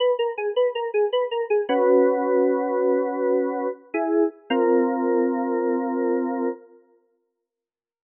Music > Solo instrument
suspense loop
A good music clip I think? Idk to be honest. (made with fl studio)
155bpm, calm, music